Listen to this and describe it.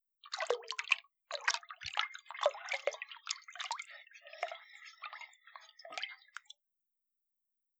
Sound effects > Natural elements and explosions
Stirring Water Free
Water in a pot being stirred.
liquid, pot, stirring, bowl, water, stir